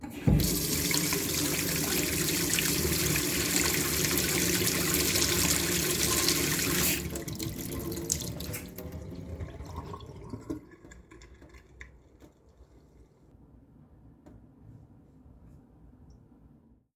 Sound effects > Objects / House appliances
WATRPlmb-Samsung Galaxy Smartphone, CU Sink Turn On, Run, Off, Drain Nicholas Judy TDC
A sink turn on, run, off and drain. Recorded at Cold Harbor Family Medicine.